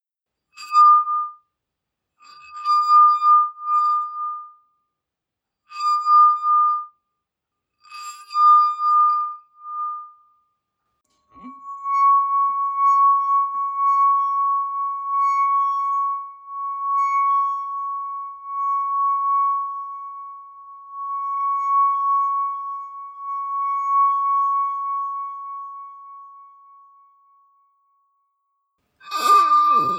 Sound effects > Objects / House appliances
Glass Harp - Singing Vibration
Singing glasses. The first part is short, then very long, with a beautiful tonal note. The last sounds are too wet. * No background noise. * No reverb nor echo. * Clean sound, close range. Recorded with Iphone or Thomann micro t.bone SC 420.
moist, cristal, french, harp, drink, dining, kitchen, spirit, reception, touch, glasses, wine, diningroom, fizzy, condensation, damp, finger, steam, tableware, celebrate, champagne, ringing, mist, water, singing, glass, spirituous, france, singingglass, sing